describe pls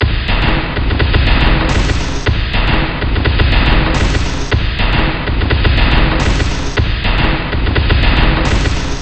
Instrument samples > Percussion
This 213bpm Drum Loop is good for composing Industrial/Electronic/Ambient songs or using as soundtrack to a sci-fi/suspense/horror indie game or short film.
Samples, Soundtrack, Ambient, Weird, Loopable, Industrial, Alien, Underground